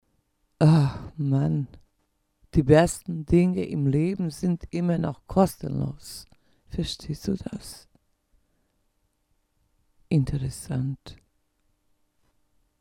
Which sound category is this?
Sound effects > Human sounds and actions